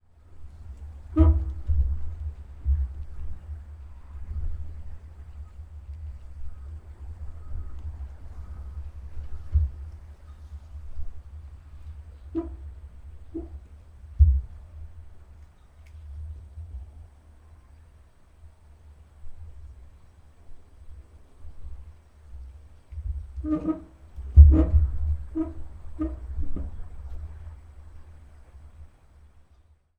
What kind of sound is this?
Sound effects > Natural elements and explosions
Rose bush scraping against a metal box tube downpipe in a moderate gusty wind.
rose, gusty, sfx, scraping, metal